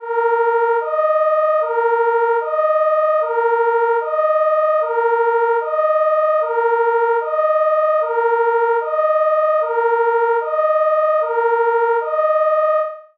Sound effects > Vehicles
Martinshorn (Siren)
The sound of a German Martinshorn (Emergency vehicle siren) full synthized in FL-Studio using the default "3x Osc"-VST.
alarm, ambulance, emergency, feuerwehr, fireengine, firefighter, firetruck, german, krankenwagen, martinshorn, siren, sirene, synthized, vehicle